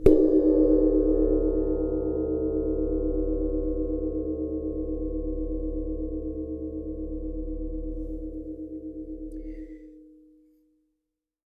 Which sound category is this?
Music > Solo instrument